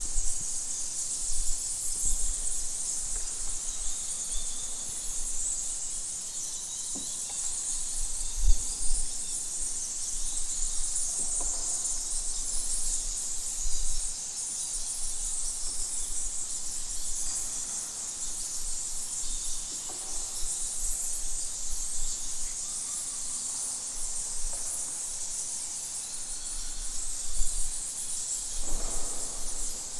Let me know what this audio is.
Soundscapes > Nature
Bats in Lod Cave, Thailand (March 10, 2019)
Recording of bats flying and echoing inside Lod Cave, Thailand. Natural reverb, high-frequency squeaks, and cave ambiance.